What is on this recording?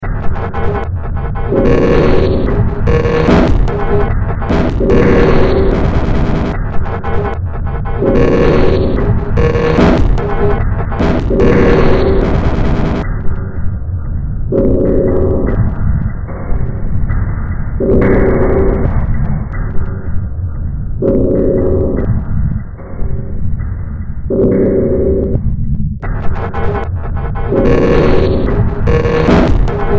Music > Multiple instruments
Demo Track #3666 (Industraumatic)
Noise, Industrial, Sci-fi, Games, Cyberpunk, Ambient, Underground, Horror, Soundtrack